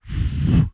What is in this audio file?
Sound effects > Other
41 - Applying the "Breezed" Status Foleyed with a H6 Zoom Recorder, edited in ProTools